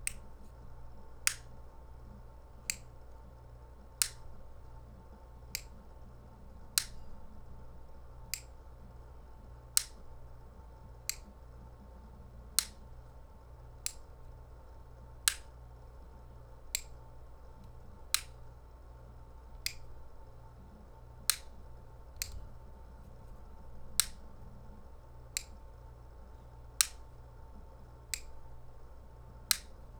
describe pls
Sound effects > Objects / House appliances
A spinbrush electric toothbrush turning on and off. Not working.